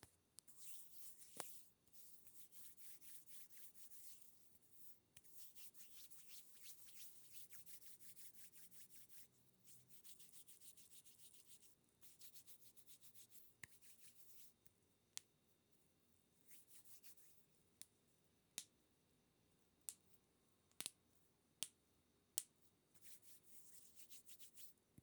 Sound effects > Human sounds and actions
HUMANSkin hand rubbing cracking fingers dry sound NMRV FSC2
Caressing hands dry sound and cracking fingers
Cracking, Hand